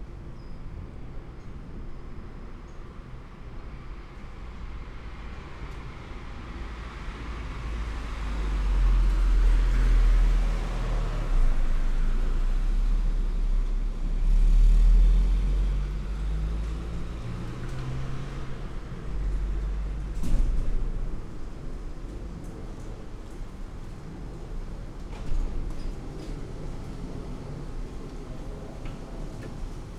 Soundscapes > Urban
OM-08 vs EM272 outdoor - EM272 version
Outdoor mic comparison : Rycote OM-08 vs Clippy EM272 – EM272 version. For my friend Nico and all of you who might be interested, here is a microphone comparison between Rycote OM-08 and Micbooster Clippy EM272. This is the EM272 version, recorded from my balcony. One can hear a generic suburban atmosphere, with some cars passing by in the street, some people talking (kid and adults), and noises from the surroundings. Mics were placed about 36cm apart. Recorded with zoom H5Studio.
outdoor, balcony, EM272, street, Clippy, comparison